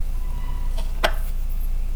Sound effects > Other mechanisms, engines, machines
shop foley-013
shop, perc, bop, rustle, metal, thud, tools, foley, little, sound, tink, crackle, percussion, strike, bang, oneshot, bam, boom, wood, knock, sfx, pop, fx